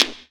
Instrument samples > Percussion
tom 1960 1a low-cut
It is only a trigger of a floor 1 tom (not the deepest, not the bassest floor 2 tom). I applied low cut (a low-cut filter) from 200 ㎐. The attack is triple, but with additions and mostly deletions in order it doesn't sound like a roll (drumroll).
attack, clack, click, corpsegrind, death, evil, floor, floor-1, floortom, grindcorpse, impact, kind, low-cut, metal, percussion, pop, rock, snick, softcore, thrash, thrash-metal, tick, timber, tom, tom-attack, tomsnick, tom-tom, trigger